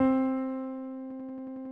Instrument samples > Piano / Keyboard instruments
a mellow piano sound